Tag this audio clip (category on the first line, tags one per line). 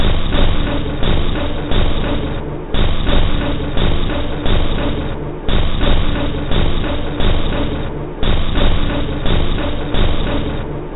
Instrument samples > Percussion
Samples
Packs
Weird
Loop
Loopable
Underground